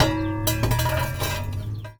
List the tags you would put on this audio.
Sound effects > Objects / House appliances
Ambience
Atmosphere
Bang
Bash
Clang
Clank
Dump
dumping
dumpster
Environment
Foley
FX
garbage
Junk
Junkyard
Machine
Metal
Metallic
Perc
Percussion
rattle
Robot
Robotic
rubbish
scrape
SFX
Smash
trash
tube
waste

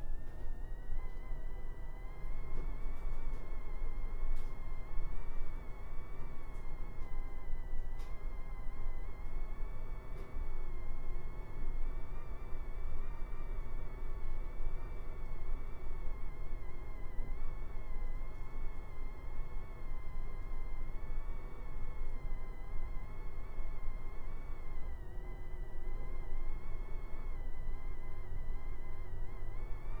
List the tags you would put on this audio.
Soundscapes > Indoors
vent
air-conditioning
heater
air

